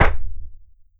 Sound effects > Human sounds and actions
LoFiFootstep Carpet 02
Shoes on carpet. Lo-fi. Foley emulation using wavetable synthesis.